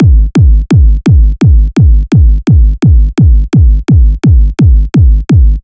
Music > Multiple instruments
This is a kickbass in G2